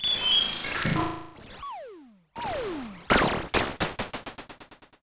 Sound effects > Experimental
Analog Bass, Sweeps, and FX-114
robotic, analog, oneshot, scifi, effect, analogue, sci-fi, mechanical, pad, electro, sweep, vintage, fx, bass, retro, basses, alien, sfx, sample, trippy, bassy, dark, korg, electronic, robot, complex, synth, weird, machine, snythesizer